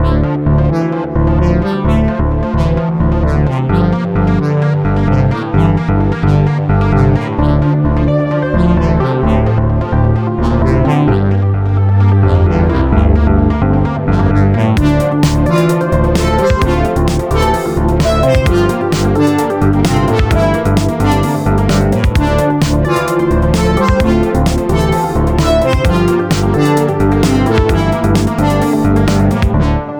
Music > Multiple instruments
electronic synth loop
a loop made in fl-studio using built-in plugins 130-bpm, 4/4 time signature